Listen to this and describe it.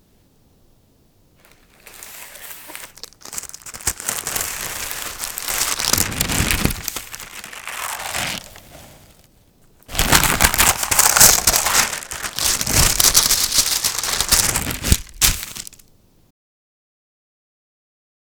Sound effects > Objects / House appliances
baking-paper-removing
A baking paper being removed from a baking tray. Recorded with Zoom H6 and SGH-6 Shotgun mic capsule.